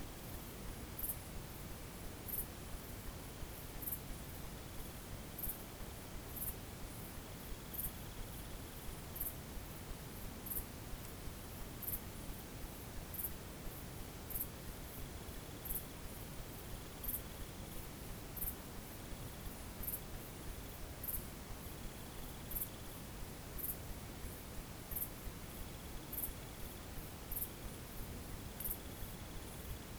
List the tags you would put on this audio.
Soundscapes > Nature
rural country-side H2n Bourgogne night Gergueil countryside Bourgogne-Franche-Comte MS-RAW France 21410 Cote-dOr MS Zoom

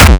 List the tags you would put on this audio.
Instrument samples > Percussion
BrazilFunk Distorted EDM Kick

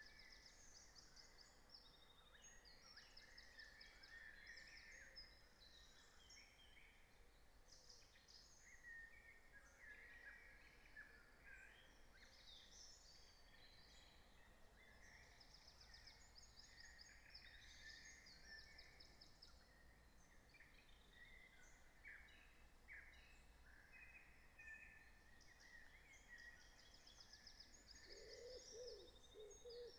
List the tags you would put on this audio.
Soundscapes > Nature
sound-installation Dendrophone

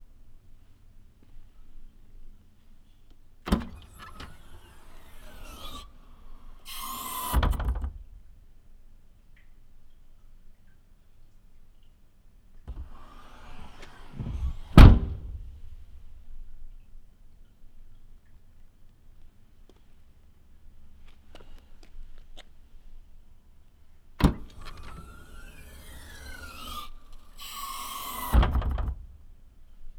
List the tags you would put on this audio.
Sound effects > Other mechanisms, engines, machines
open door close strut opening

